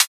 Instrument samples > Synths / Electronic
A hi-hat one-shot made in Surge XT, using FM synthesis.